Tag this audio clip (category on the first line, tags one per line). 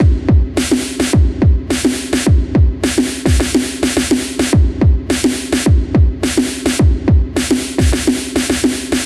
Music > Solo percussion
Kit
music
Electronic
Modified
Loop